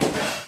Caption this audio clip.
Sound effects > Other
Metallic impact sound effect. Recorded with my phone.
metal, impact, drop, land, throw, hit, thud, collide